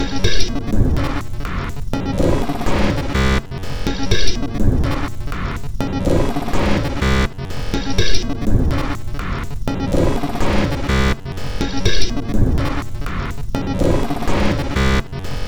Instrument samples > Percussion
This 124bpm Drum Loop is good for composing Industrial/Electronic/Ambient songs or using as soundtrack to a sci-fi/suspense/horror indie game or short film.
Industrial, Packs, Dark, Underground, Soundtrack, Ambient, Drum, Alien, Loopable, Loop, Weird, Samples